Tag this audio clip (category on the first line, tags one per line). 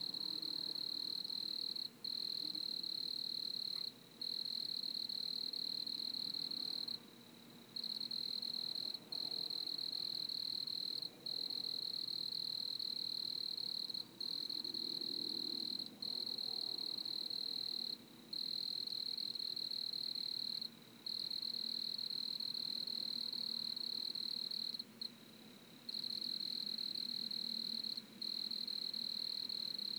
Soundscapes > Nature
Field-recording
Insects
Drowsy
Night